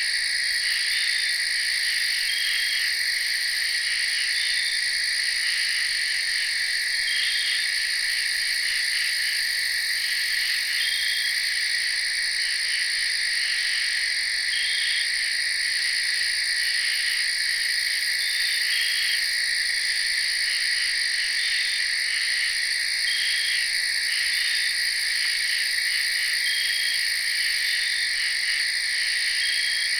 Soundscapes > Nature
Night Insects in September - Midwest US (loop)
Night chorus recorded after midnight in rural northern Indiana. Crickets, katydids, and (I think some) cicadas can be heard. Recorded with a Tascam Portacapture X6 using internal mics. Some EQ'ing applied, loops seamlessly.
crickets, ambient, ambiance, summer, chorus, bugs, nature, indiana, insects, cicada, ambience, midwest, field-recording, rural, x6, portacapture, pond, night, seamless, tascam, cricket, forest, woods, katydid